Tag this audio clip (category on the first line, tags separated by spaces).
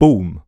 Speech > Solo speech
Vocal voice Single-take Man singletake chant oneshot word Neumann hype Male Mid-20s Tascam U67 FR-AV2